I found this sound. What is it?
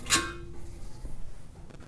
Sound effects > Other mechanisms, engines, machines
Handsaw Oneshot Metal Foley 21
Handsaw fx, tones, oneshots and vibrations created in my workshop using a 1900's vintage hand saw, recorded with a tascam field recorder
household, plank, metal, twang, percussion, twangy, perc, vibe, shop, tool, vibration, fx, hit, metallic, smack, foley, saw, handsaw, sfx